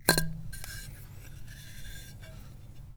Music > Solo instrument
Marimba Loose Keys Notes Tones and Vibrations 31-001
oneshotes, woodblock, rustle, block, notes, thud, keys, perc, fx, foley, percussion, tink, marimba, loose, wood